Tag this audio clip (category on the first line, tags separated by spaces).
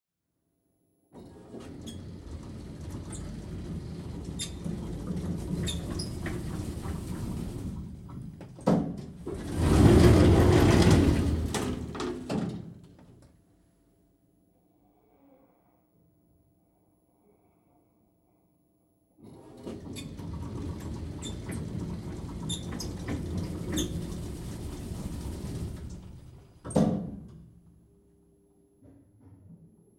Sound effects > Other mechanisms, engines, machines
3D ambisonics binaural elevator spatial